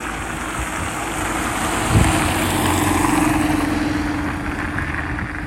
Sound effects > Vehicles
car sunny 12
car, engine, vehicle